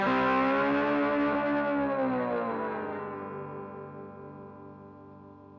Instrument samples > String
sound of a guitar recorded with a scarlet 2i2 interface into Logic Pro with a small amount of gain